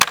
Sound effects > Objects / House appliances
house door open
Opening of my house front door. Recorded with Olympus LS-P4. Cut and processed using Audacity.
open, opening, wooden